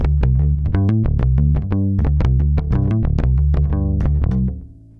Music > Solo instrument
jumpin blues rock funk riff
pick, electricbass, low, slide, notes, slap, rock, basslines, funk, lowend